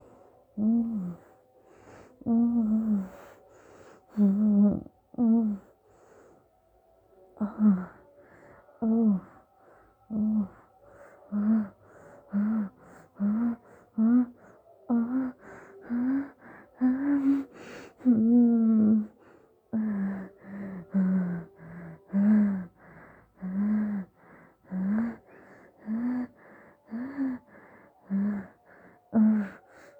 Sound effects > Other
Girl soft moaning
Girl, moaning, mastrabate